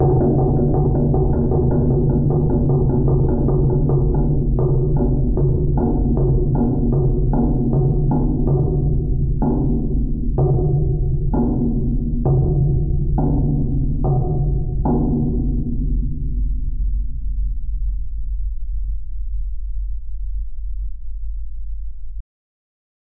Sound effects > Other mechanisms, engines, machines
METLTonl MultiHit
striking a metal rail numerous times (overlapping reverb) with my hands and recorded with a Zoom H6e and Interharmonics Geophone.
bang, banging, hand-rail, hit, hitting, metal, metallic, rail, sfx, strike, striking